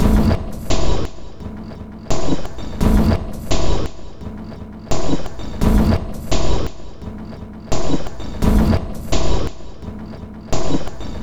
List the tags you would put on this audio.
Instrument samples > Percussion
Weird
Samples
Loopable
Industrial
Loop
Soundtrack
Dark
Ambient
Packs
Drum
Underground
Alien

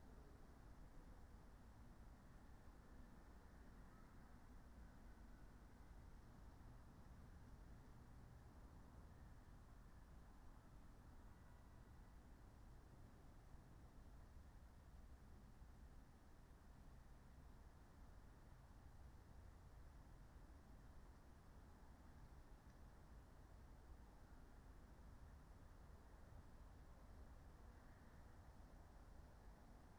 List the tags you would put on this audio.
Soundscapes > Nature
sound-installation
Dendrophone
field-recording
weather-data
raspberry-pi
modified-soundscape
alice-holt-forest
soundscape
natural-soundscape
data-to-sound
artistic-intervention
phenological-recording
nature

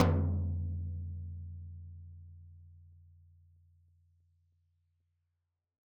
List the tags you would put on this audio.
Music > Solo percussion
acoustic
beat
beatloop
beats
drum
drumkit
drums
fill
flam
floortom
instrument
kit
oneshot
perc
percs
percussion
rim
rimshot
roll
studio
tom
tomdrum
toms
velocity

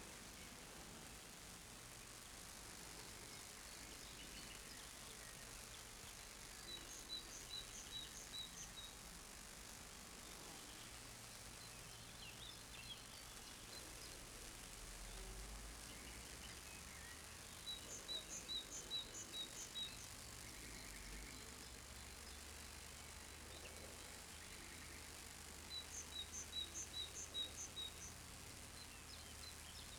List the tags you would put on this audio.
Soundscapes > Other
electricite
field-recording
ambiance
haute-tension
ambience
buzz
hum
edf
power
noise
voltage

